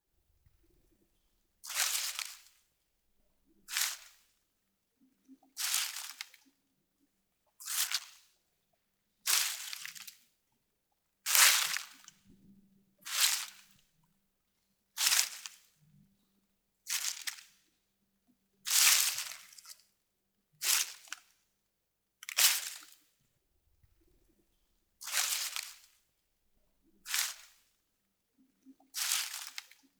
Sound effects > Natural elements and explosions
brushing leaves with foot
Brushing leaves using foot
leaves, forest, brushing